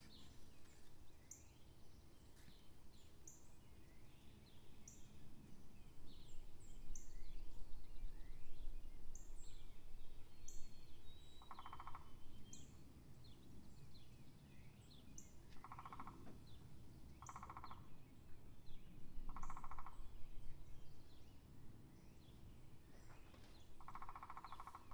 Soundscapes > Nature
Woodpecker in my backyard pecking away at a maple tree.
Birds, Nature, Backyard, Woodpecker, Environment, Peaceful, Forest